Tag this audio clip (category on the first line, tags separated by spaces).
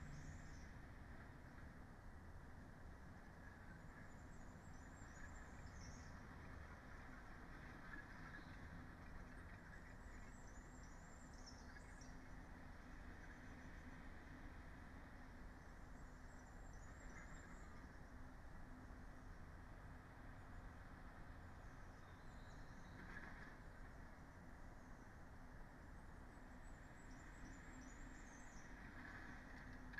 Soundscapes > Nature
alice-holt-forest artistic-intervention data-to-sound Dendrophone field-recording natural-soundscape nature phenological-recording raspberry-pi sound-installation weather-data